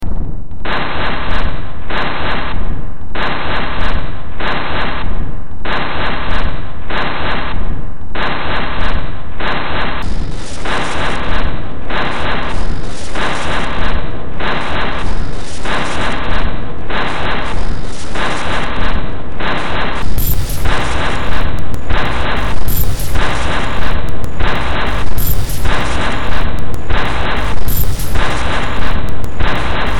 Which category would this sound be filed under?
Music > Multiple instruments